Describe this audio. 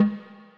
Music > Solo percussion
Snare Processed - Oneshot 197 - 14 by 6.5 inch Brass Ludwig
acoustic, beat, drum, drumkit, flam, ludwig, oneshot, perc, percussion, processed, realdrum, reverb, rim, rimshot, sfx, snares